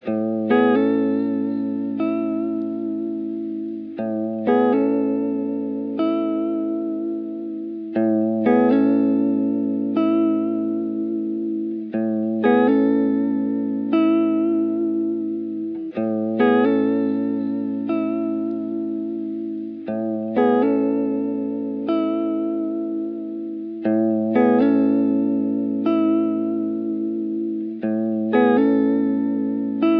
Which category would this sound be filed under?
Music > Solo instrument